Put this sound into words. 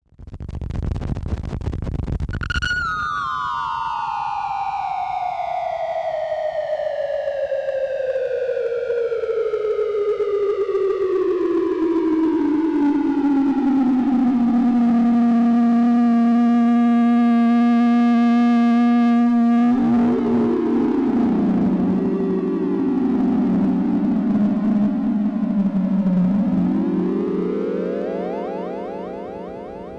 Sound effects > Experimental
space ship re-enters earth's atmosphere - lofi sci-fi sound effect
A 1970s style sound effect of a spaceship re-entering the atmosphere of Earth. It starts off pushing through space debris, then crashing down to the surface. Made with a sine wave generator going into a Panasonic tape deck. Recorded onto normal bias tape, with reverb.